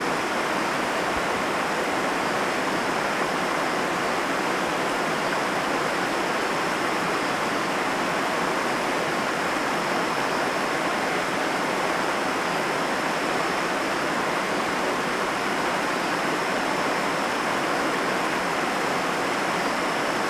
Soundscapes > Nature
River flowing downstream over rocks. Recorded with a ZOOM H6 and a Sennheiser MKE 600 Shotgun Microphone. Go Create!!!